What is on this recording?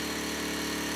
Sound effects > Other mechanisms, engines, machines
saw4 low
Chainsaw "cruising" at low RPM. As i found out, works great as an engine noise for a moped. Recorded with my phone.